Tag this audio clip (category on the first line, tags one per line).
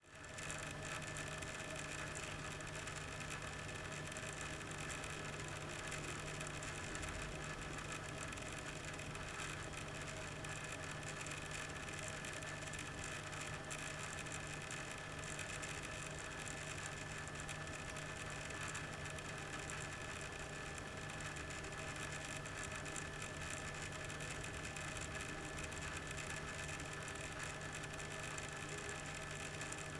Sound effects > Objects / House appliances
heating
hydraulics
mechanics
mechanism
pipes
system
water